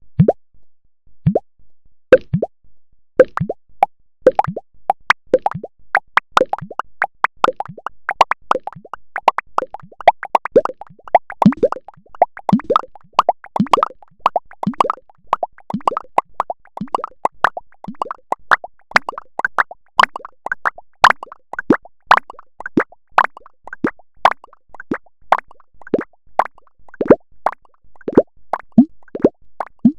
Soundscapes > Synthetic / Artificial
Tape loop done with blip blops 001
A tape loop creation done with the sound of a series of blip blops.
blip, blop, gauss, ipad, loop